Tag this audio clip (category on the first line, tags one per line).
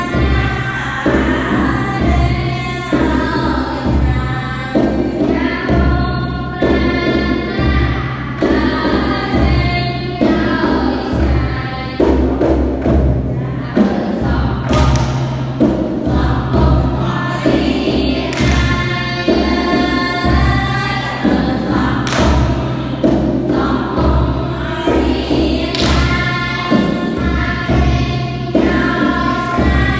Soundscapes > Urban
ambient
Cambodia
children
dance
education
Kampot
lively
music
school
voices